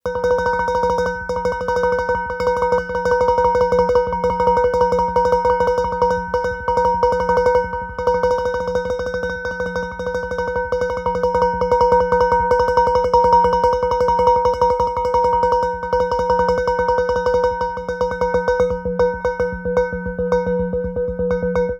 Sound effects > Other
Fingers tapping on metal using a contact mic.